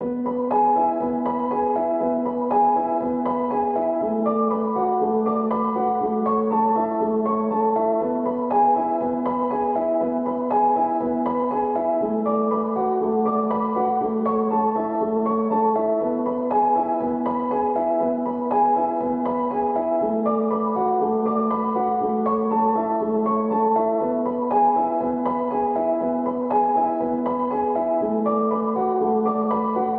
Music > Solo instrument
Piano loops 143 efect 4 octave long loop 120 bpm
reverb, pianomusic, samples, simple, music, 120bpm, 120, loop, free, simplesamples, piano